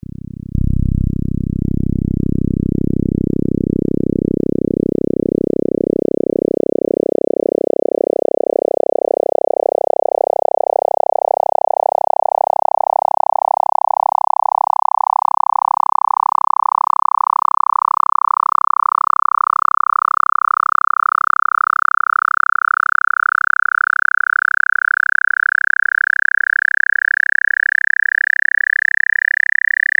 Instrument samples > Synths / Electronic
07. FM-X RES2 SKIRT5 RES0-99 bpm110change C0root
FM-X, MODX, Montage, Yamaha